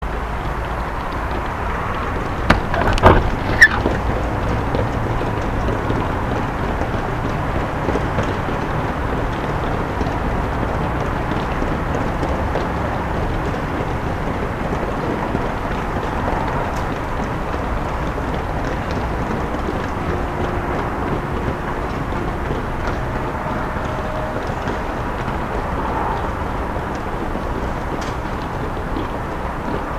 Soundscapes > Urban
46. PKO Half-Marathon, Szczecin, 31.08.2025
A recording of runners, running their half-marathon under our windows
ambience, marathon, people, shoes, town, cheering